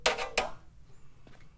Sound effects > Other mechanisms, engines, machines
Handsaw Oneshot Metal Foley 4
foley, fx, handsaw, hit, household, metal, metallic, perc, percussion, plank, saw, sfx, shop, smack, tool, twang, twangy, vibe, vibration